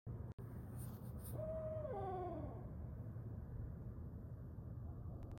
Soundscapes > Nature
Owl visit under the Harvest Moon
Recorded on 20251006T20:08:00 on an iphone 16. I went out after dusk to view the brilliant harvest moon and an owl swooped in and landed overhead, presumably to discuss the beauty of the evening (or to inform me I was impinging on their turf, I couldn't quite tell which). In any case it was a beautiful night. This is the only snip i had absent of car traffic.
night, owl, hooting, field-recording